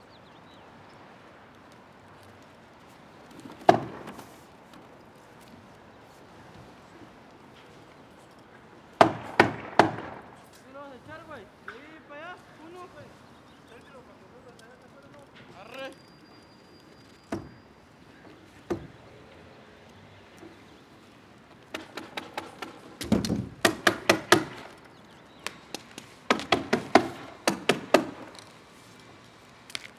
Soundscapes > Urban
A roofing crew hammering on plastic covering on the roof directly next to my windows. Various neighborhood traffic and activities can also be heard.
loud, hammer, bang, field-recording, urban